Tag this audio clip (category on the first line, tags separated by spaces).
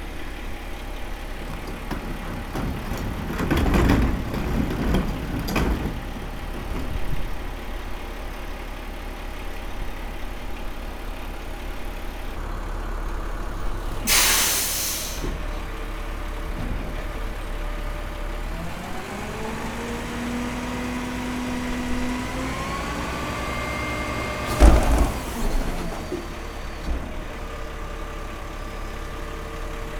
Soundscapes > Urban
Gabrbage-Truck Heavy-Equipment Trash